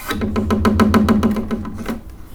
Sound effects > Other mechanisms, engines, machines
Handsaw Beam Plank Vibration Metal Foley 15
foley, fx, handsaw, hit, household, metal, metallic, perc, percussion, plank, saw, sfx, shop, smack, tool, twang, twangy, vibe, vibration